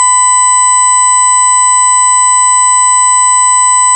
Sound effects > Experimental
Split waveform test
Warning LOUD. Trying to split the color of the waveform preview horizontally, top and bottom having a different colors. By using some DC offset (made with the "Even harmonics" distortion on Audacity. First test was with a mix of two frequencies, each with a DC offset on opposing phase. Second test was just a DC offset of one frequency (it worked, transparency on one side, colour on the other) Third test I re-tried two frequencies, this time I added a square wave tremolo on each. Idea was the DC offset of each would apply a color to top and bottom. And the Tremolo would rapidly switch between each colour/side. Theoretically resulting in a cycle of top blue, bottom red. Then I was hoping on a long sound, it would compress the waveform so that cycling of colors would no longer be visible and just appear as one. Which it did, but not Top blue bottom red, just a mix of both resulting in a yellow ish waveform.
color, DC-Offset, freesound20, Preview, Sound-to-image